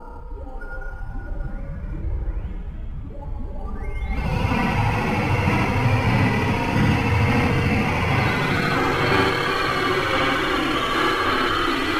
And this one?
Sound effects > Electronic / Design
Murky Drowning 4
sound-design, drowning, dark-soundscapes, horror, mystery, scifi, PPG-Wave, vst, dark-design, cinematic, sci-fi, science-fiction, content-creator, dark-techno, noise-ambient, noise